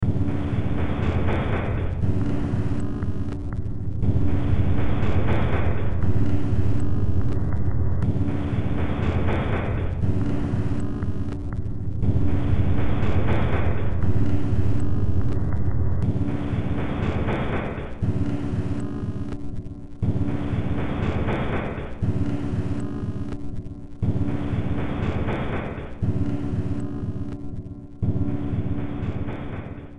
Music > Multiple instruments
Demo Track #3255 (Industraumatic)
Ambient, Cyberpunk, Industrial